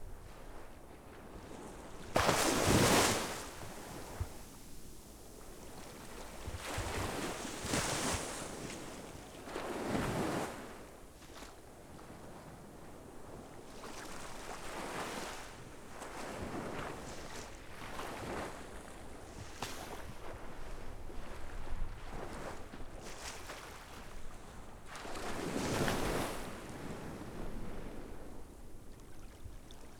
Soundscapes > Nature
WATRSurf-Gulf of Mexico Offshore, Waistdeep in Gulf, breaking waves, strong surf 545AM QCF Gulf Shores Alabama Zoom H3VR
4-channel surround audio, Waist-Deep in gulf of mexico with strong surf.
wind, field-recording, surf, beach